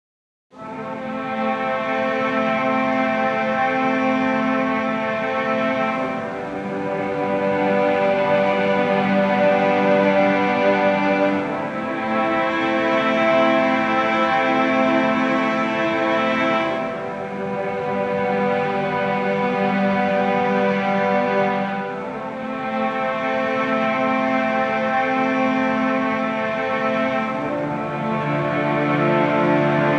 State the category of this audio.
Music > Multiple instruments